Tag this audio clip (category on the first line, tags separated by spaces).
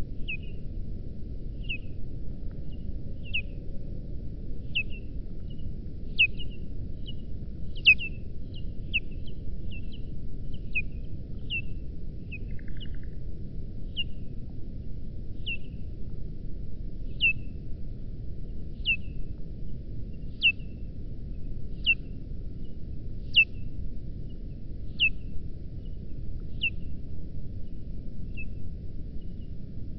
Sound effects > Animals
2025 81000 Albi bat bats City Early Early-morning France FR-AV2 hand-held handheld Mono morning NT5 Occitanie Outdoor Rode Saturday Single-mic-mono slowed Tarn Tascam urbain Wind-cover WS8